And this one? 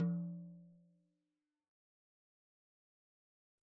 Music > Solo percussion
Hi Tom- Oneshots - 22- 10 inch by 8 inch Sonor Force 3007 Maple Rack
acoustic, beat, beatloop, beats, drum, drumkit, drums, fill, flam, hi-tom, hitom, instrument, kit, oneshot, perc, percs, percussion, rim, rimshot, roll, studio, tom, tomdrum, toms, velocity